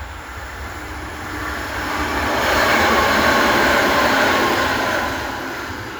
Soundscapes > Urban
A Tram driving by at high speed in Hervanta/Hallila, Tampere. Some car traffic or wind may be heard in the background. The sound was recorded using a Samsung Galaxy A25 phone